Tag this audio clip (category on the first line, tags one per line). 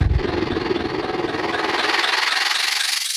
Sound effects > Experimental
alien
experimental
glitchy
hiphop
idm
percussion
pop
whizz
zap